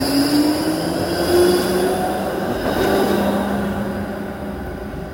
Sound effects > Vehicles
Sound of a tram moving. Tram engine humming. This sample was recorded in Tampere, Finland during early winter (wet roads). Device used for recording was iPhone SE 2020. Sample might contain wind or other distant background noise. This recording was done for an audio processing assignment.